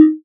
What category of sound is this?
Instrument samples > Synths / Electronic